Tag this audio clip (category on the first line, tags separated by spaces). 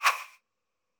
Sound effects > Other
magic projectile ui